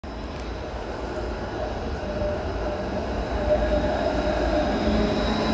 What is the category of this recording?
Soundscapes > Urban